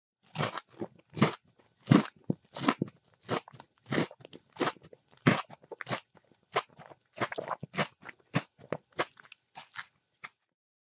Sound effects > Other
FOODEat Cinematis RandomFoleyVol2 CrunchyBites CrunchyChocolateBite ClosedMouth SlowChew Freebie
bag, bite, bites, chocolate, crunch, crunchy, design, effects, foley, food, handling, plastic, postproduction, recording, rustle, SFX, snack, sound, texture